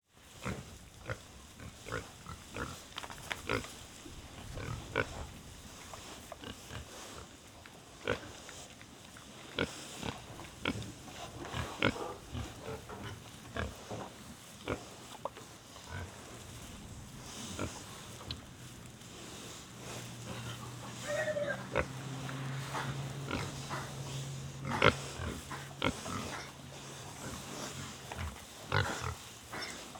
Soundscapes > Nature
Pigs grunt and oink
Recorded in a farm at Ourches village (Drome, France) with a stereo microphone audiotechnica BP4025. Enregistré à Ourches dans la Drôme (France) avec un micro stéréo audiotechnica BP4025.
oink, cochon, farm, pig